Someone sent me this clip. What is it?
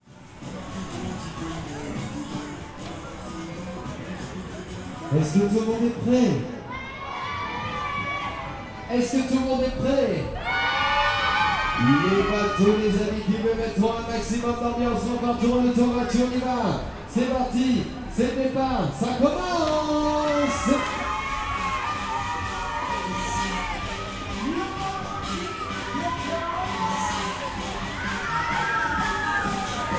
Soundscapes > Urban
250424 172145 FR Kids enjoying funfair in Paris
Kids and teen-agers enjoying a fairground ride at a funfair in Paris, France. (take 3) I made this recording while kids and teen-agers were enjoying a fairground ride in a famous funfair called ‘’la Foire du Trône’’, taking place in eastern Paris (France), every year during late spring. Recorded in April 2025 with a Zoom H6essential (built-in XY microphones). Fade in/out applied in Audacity.
ambience, amusement-park, atmosphere, attraction, children, crowd, fairground, field-recording, France, fun, funfair, kids, lively, machine, machines, music, noise, noisy, Paris, people, ride, rides, roller-coaster, rollercoaster, scream, screaming, soundscape, teen-agers, voices, walla